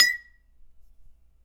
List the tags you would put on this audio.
Sound effects > Objects / House appliances
drill foley fx glass industrial natural stab

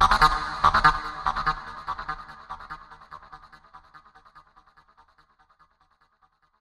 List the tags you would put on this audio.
Sound effects > Electronic / Design
trance goa lead psytrance goatrance goa-trance psy-trance 145bpm

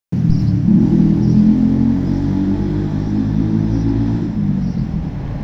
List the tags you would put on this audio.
Soundscapes > Urban
atmophere field recording

